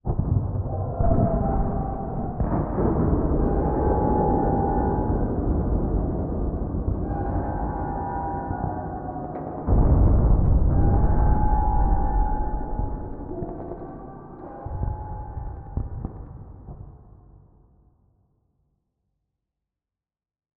Sound effects > Other
Distant Commotion
A disaster of sorts happening off in the distance for a moment before fading away.
distant, scream, alarm, battle, creaking, explosion